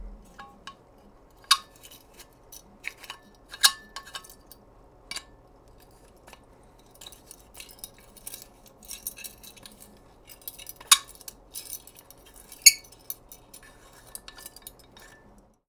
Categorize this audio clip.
Sound effects > Objects / House appliances